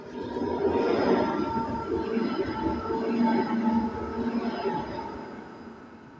Sound effects > Vehicles
city tram
A tram driving by
tram, tramway, transportation, vehicle